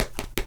Sound effects > Objects / House appliances
Sewn Stitched Fixed Fast
cloth, clothing, fabrich, Fix, fixed, Fixer, Fixing, game-sound, hand-sewing, handsewing, item, needle, pin, pins, pop, prick, puncture, Quick, seamster, seamstress, Sew, Sewer, Sewing, Sewn, Stitch, Stitched, Stitcher, Stitching, textile, thread